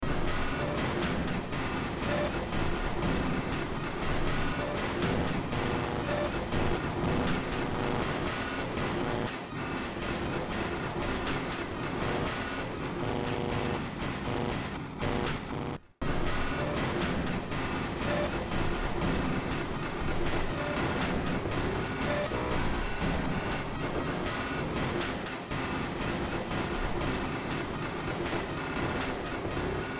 Music > Multiple instruments
Demo Track #3294 (Industraumatic)
Horror, Underground, Cyberpunk, Games, Soundtrack